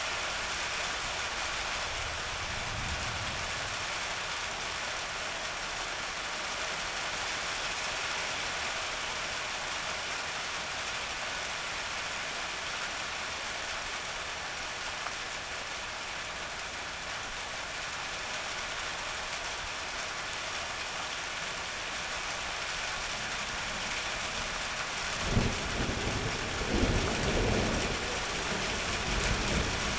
Sound effects > Natural elements and explosions
Thunderstorm with moderate level rain falling onto a metal roof with overflowing gutters dripping onto cement and coy mats. Recorded with a Zoom H6.